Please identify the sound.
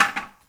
Sound effects > Other mechanisms, engines, machines
metal shop foley -219
bam, bang, boom, bop, crackle, foley, fx, knock, little, metal, oneshot, perc, percussion, pop, rustle, sfx, shop, sound, strike, thud, tink, tools, wood